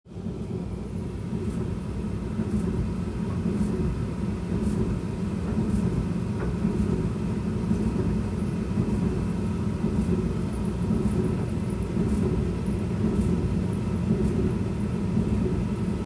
Sound effects > Objects / House appliances
Tumble dryer running
A running tumble dryer- recorded using an ipad air
Kitchen, Machine